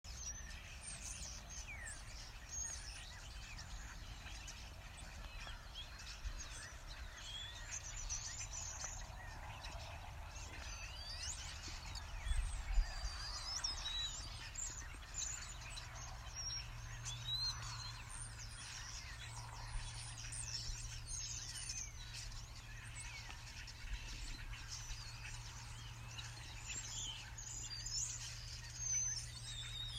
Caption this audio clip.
Soundscapes > Nature
Morning ambience with starlings 11/15/2021
Morning ambience on a farm with starlings
field-recordings, birds, morning, starlings, country, farmlife